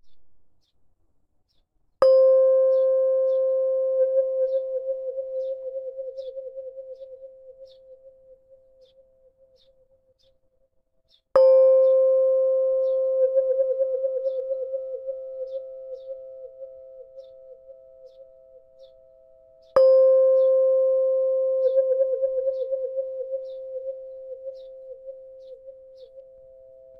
Instrument samples > Percussion
wah wah tube by Schlagwerk producing several short lasting overtones recorded on Pixel 6 pro